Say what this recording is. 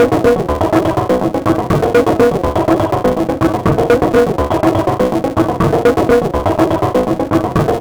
Instrument samples > Percussion
This 123bpm Drum Loop is good for composing Industrial/Electronic/Ambient songs or using as soundtrack to a sci-fi/suspense/horror indie game or short film.
Ambient, Underground, Drum, Soundtrack, Dark, Weird, Loop, Packs, Industrial, Alien, Samples, Loopable